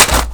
Sound effects > Objects / House appliances
PAPRImpt-Blue Snowball Microphone, CU Paper, Punch, Rip Nicholas Judy TDC
A paper punch and rip.
punch, paper, Blue-Snowball, rip, Blue-brand